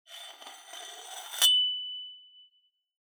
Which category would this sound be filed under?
Sound effects > Objects / House appliances